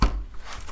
Sound effects > Other mechanisms, engines, machines
metal shop foley -151
bam, sound, fx, wood, percussion, thud, tools, crackle, foley, pop